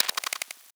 Sound effects > Electronic / Design
ROS-FX One Shoot 1
Synthed with Oigrandad 2 granular. Sample used from bandlab.
Botanical, FX, Organic